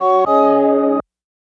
Music > Other
Completed Stinger
A bright and vibrant sound, a game-clear synthy motif! Produced on a Korg Wavestate, mastered at -3dBu in Pro Tools.
chime, jingle, bumper, effect, sound-logo, ui, bright, stinger, soundeffect, sfx, motif, sound-design, bump, game